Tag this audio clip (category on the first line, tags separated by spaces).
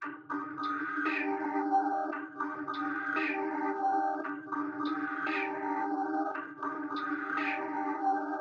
Speech > Processed / Synthetic
trance analog